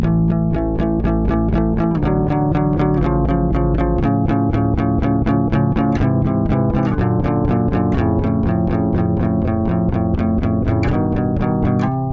Music > Solo instrument
chaos progression
bass, chords, electricbass, fuzz, harmonics, low, lowend, riff, rock, slap